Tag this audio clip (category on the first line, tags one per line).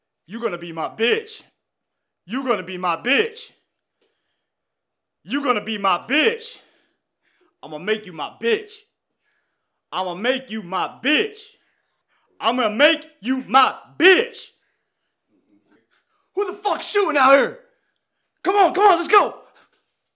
Speech > Solo speech
combat
enemy
fighting
gasp
goon
Henchman
punch
thug